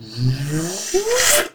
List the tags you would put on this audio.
Sound effects > Electronic / Design
Drum FX Music

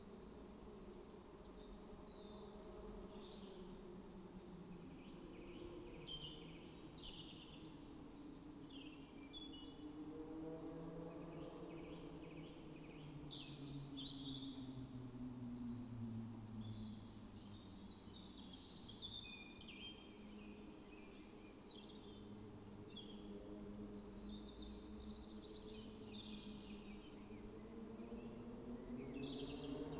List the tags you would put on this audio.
Soundscapes > Nature
artistic-intervention phenological-recording weather-data

